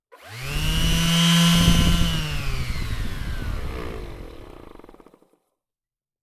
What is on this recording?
Sound effects > Other mechanisms, engines, machines
makita orbital sander foley-009
Foley, fx, Household, Mechanical, Metallic, Motor, sANDER, sANDING, Scrape, sfx, Shop, Tool, Tools, Woodshop, Workshop